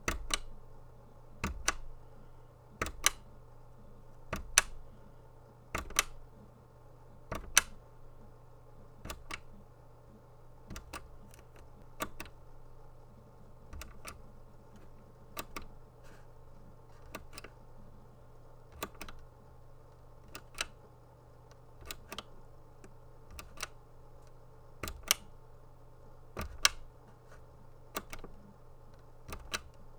Sound effects > Other mechanisms, engines, machines
MECHClik-Blue Snowball Microphone Portable CD G Karaoke Player, Power Button, Press, On, Off Nicholas Judy TDC
A portable CD-G karaoke player power button being pressed on and off.
karaoke-player, portable, press, off, foley, Blue-Snowball, cd-g, button, power, Blue-brand